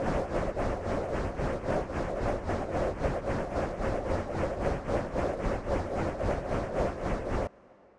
Sound effects > Electronic / Design
a Normal Airy Whoosh Spin, designed with Pigments via studio One